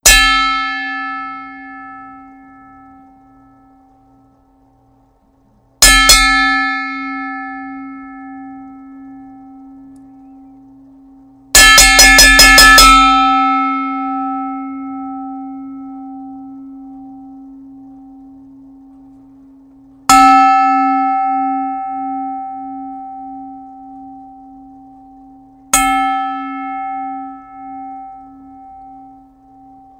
Sound effects > Objects / House appliances
BELLLrg-Blue Snowball Microphone, CU Fight, Rings, Various Nicholas Judy TDC
Various fight bell rings.
fight, Blue-Snowball, ring, bell, Blue-brand